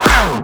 Sound effects > Human sounds and actions
Sound FX Punch (With Voice)
This is a punch sound created in Ableton Live with my voice included.
hit, slaps, punch, slap, kick